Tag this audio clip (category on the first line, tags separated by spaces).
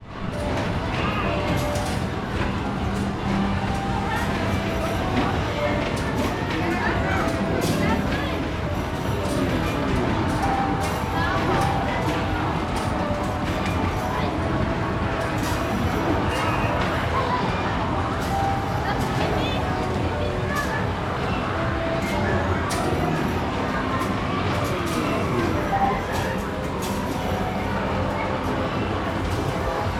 Soundscapes > Indoors
mall atmosphere noise arcade-games loud play amusement children soundscape playing Calapan-city shout busy ambience noisy voices field-recording Philippines fun shouting music games teen-agers kids lively